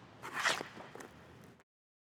Sound effects > Other
Ice Hockey Sound Library Wrist Shot
Taking a full, sweeping wrist shot.
Ice-Hockey, Action, Sports